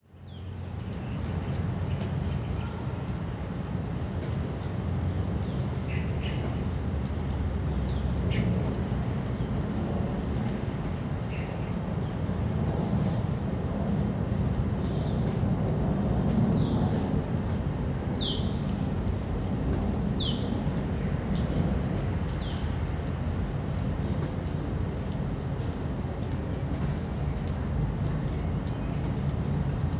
Soundscapes > Nature
Neighbourhood ambient
Neigbourhood Ambient with cars,motorcycles,birds chirping, Recorded using zoom f6 And Bp 4025 mic from audio technica
background, ambience, background-sound, ambient, ambiance, soundscape, atmosphere, general-noise